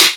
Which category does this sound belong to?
Instrument samples > Percussion